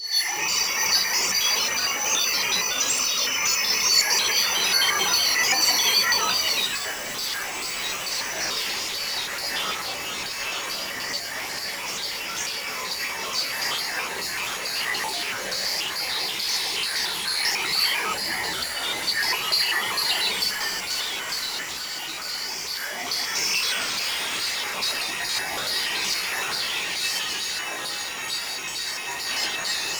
Sound effects > Electronic / Design
Sharp Tinglings 1
A sample of our blade sharpener is explored. This is an abstract noisy sample pack suitable for noise, experimental or ambient compositions.
abstract, ambient, noise, noise-ambient